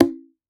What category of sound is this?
Sound effects > Objects / House appliances